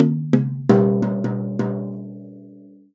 Music > Solo instrument
Toms Misc Perc Hits and Rhythms-017
Ride, Cymbals, Drums, Metal, Percussion, FX, Oneshot, Cymbal, Perc, GONG, Drum, Sabian, Crash, Custom, Kit, Paiste, Hat